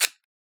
Sound effects > Objects / House appliances
Matchsticks Strike 4 Hit
Shaking a matchstick box, recorded with an AKG C414 XLII microphone.
fire, matchstick, strike-match